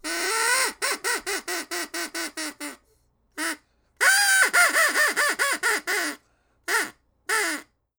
Sound effects > Animals
TOONVox-Blue Snowball Microphone, CU Laughing, Duck Nicholas Judy TDC
A laughing duck whistle.
duck, Blue-Snowball, cartoon, Blue-brand, whistle, laugh